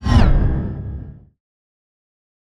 Sound effects > Other
Sound Design Elements Whoosh SFX 026
audio, elements, ambient, design, effects, element, effect, sound, swoosh, whoosh, trailer, film, movement, dynamic, fast, production, cinematic, sweeping, fx, transition, motion